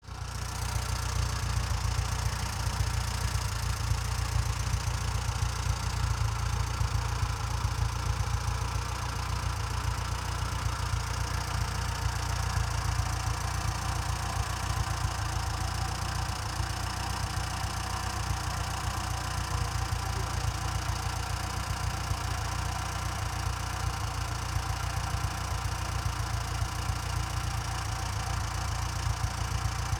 Sound effects > Vehicles
Fiat 600 MOTOR FRONT
Front POV of a engine from a Fiat 600. Recorded with: Sound Devices Mix-Pre 6-II, Sennheiser MKH 416.
engine, front, motor, start, starting